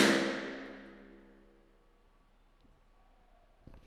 Soundscapes > Urban
distorted balloon pop